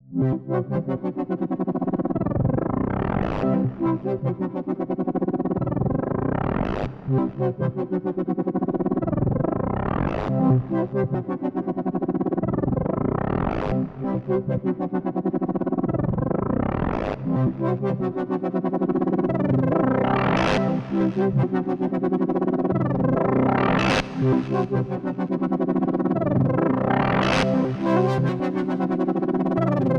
Sound effects > Electronic / Design
Sequences of glitchy alien tones and fx created with obscurium and other vst effects in FL Studio, further processed with Reaper
alien, ambience, analog, bass, creature, creepy, dark, digital, experimental, extraterrestrial, fx, glitch, glitchy, gross, industrial, loopable, machanical, machine, monster, otherworldly, sci-fi, sfx, soundeffect, sweep, synthetic, trippy, underground, warped, weird, wtf